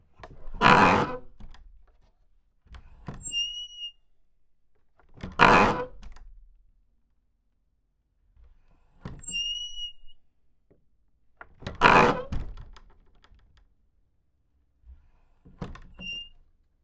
Sound effects > Objects / House appliances
Subject : A old barn door with big metal hinges and old wood. Door sounds opening/closing. Here I tried to do it fast to remove the squeek, it was just too low and present for me to be able to ^^ Date YMD : 2025 04 22 Location : Gergueil France Hardware : Tascam FR-AV2 and a Rode NT5 microphone in a XY setup. Weather : Processing : Trimmed and Normalized in Audacity. Maybe with a fade in and out? Should be in the metadata if there is.